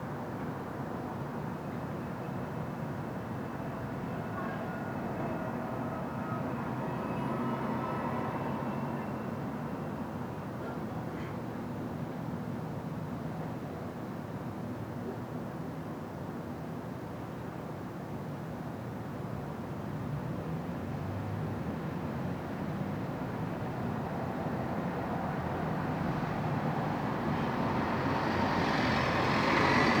Soundscapes > Urban
Late afternoon in my neighborhood. Thunder is very rare here, so it was nice to capture one, about 20 minutes later it starts to rain. Various people go about their day with a constant stream of traffic as always.
field-recording, cars, rain, ambience, city, street, thunder, noise, vehicles, traffic, urban